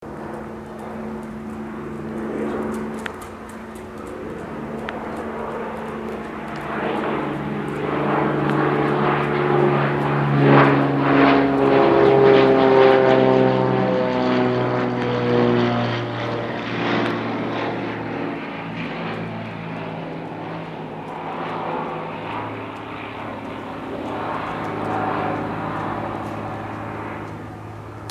Sound effects > Other mechanisms, engines, machines
Piper Malibu Matrix (XB-MWO), Flyby.
Piper Malibu Matrix (XB-MWO) flyby, 150Mts close, last 2.77 miles for land procedure. Recorded with SONY IC Recorder. Mod. ICD-UX560F
plane, flight, airplane, aircraft